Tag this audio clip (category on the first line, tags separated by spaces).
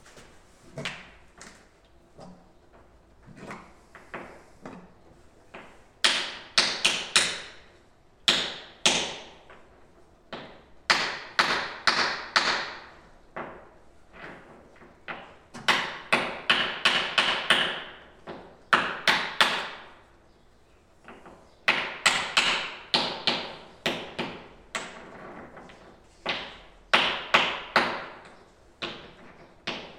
Soundscapes > Urban
hammer martello pietre rocks